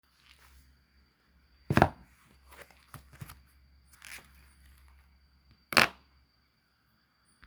Sound effects > Objects / House appliances
Putting down book and pen v2
pencil, puttingdown, table, book, pen